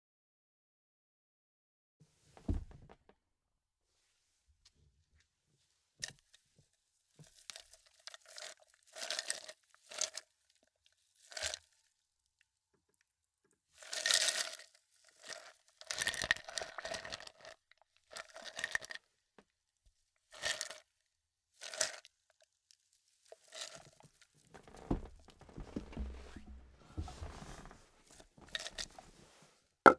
Sound effects > Objects / House appliances
ice bottle cup
bottle, cup, foley, glass, ice, pour, pouring, water, waterdrop